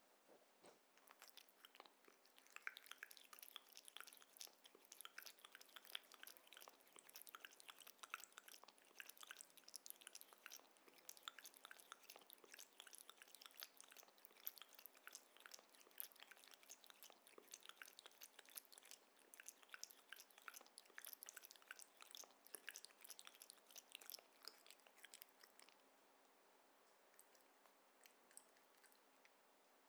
Sound effects > Animals
older cat drinking water schlop
12 year old cat Plombette drinks water. Very faint sound. Recorded with Zoom H2.
drinking, water